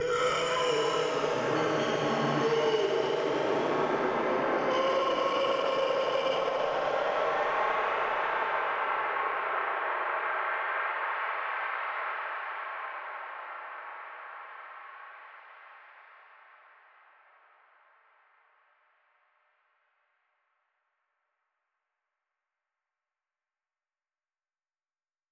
Sound effects > Experimental
Freaky Creep Sound
Made this for practice in FL Studio 2025. Used myself inhaling creepily and then added LuxeVerb warped reverb and Fruity Convolver for a more unique sound effect.
creature, creep, creepy, echo, freak, horror, inhale, monster, scary